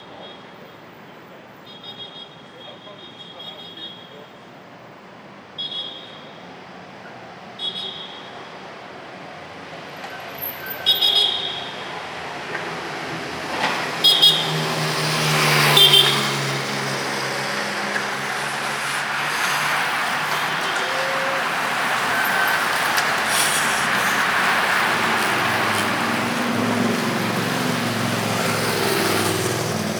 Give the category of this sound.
Soundscapes > Urban